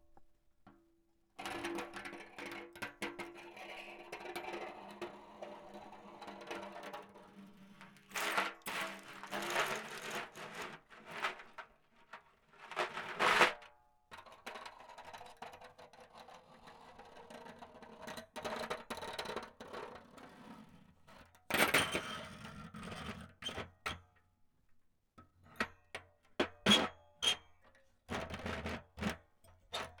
Instrument samples > Percussion
drum Scratch STE-005
drum Scratch in the studio recorded in zoom h4n
hi-hats, bassdrum, bass-drum, kick, drum, hihats, Scratch